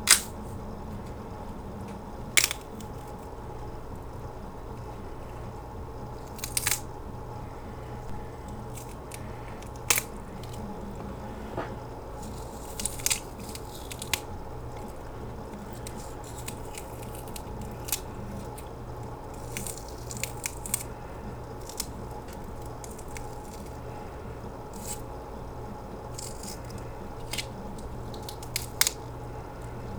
Sound effects > Natural elements and explosions
GOREBone-Blue Snowball Microphone, MCU Breaking Bones, Simulated Using Celery Sticks Nicholas Judy TDC
Bones breaking. Created using breaking celery sticks.
Blue-brand, bone, crack, foley